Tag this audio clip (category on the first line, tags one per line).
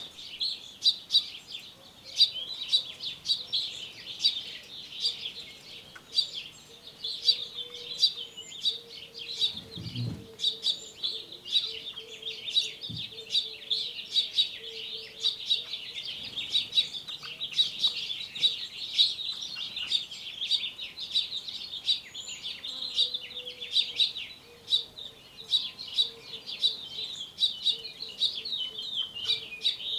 Soundscapes > Nature
bees
nature
field-recording
ambience